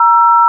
Sound effects > Electronic / Design
This is the number 0 in dtmf This is also apart of the pack 'DTMF tone 0-9'
dtmf, retro, telephone